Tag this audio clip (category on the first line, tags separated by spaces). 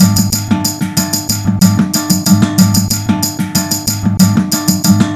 Music > Other
loop world rhythmic tambourin drumloop ethno ethnique rhythm